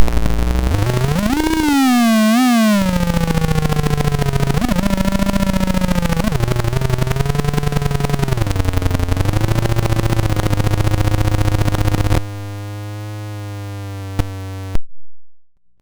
Sound effects > Electronic / Design
Optical Theremin 6 Osc dry-113
Theremin, Instrument, noisey, Bass, Electronic, Sweep, Analog, Theremins, Alien, Infiltrator, Dub, Scifi, SFX, Noise, Optical, Electro, Spacey, Experimental, Robotic, Handmadeelectronic, Sci-fi, DIY, Glitch, Otherworldly, FX, Digital, Trippy, Robot, Glitchy, Synth